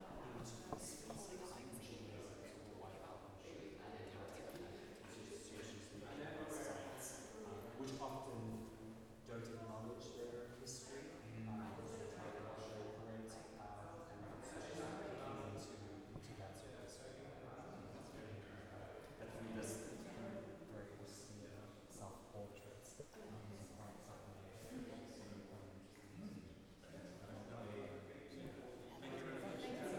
Soundscapes > Indoors
LNDN SOUNDS 010
Some snippets of talking recorded in an art gallery in central London. lots of overlapping echoing voices, mostly indiscernible. Unprocessed sound, captured with a Zoom H6
ambient, english, indoors, london